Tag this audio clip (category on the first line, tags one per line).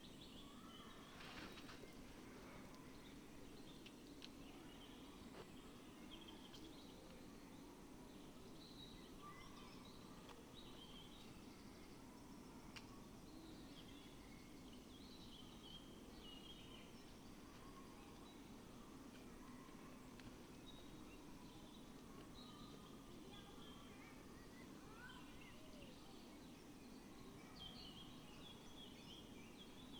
Soundscapes > Nature
raspberry-pi
nature
data-to-sound
alice-holt-forest
field-recording
Dendrophone
modified-soundscape
soundscape
sound-installation
weather-data
natural-soundscape
artistic-intervention
phenological-recording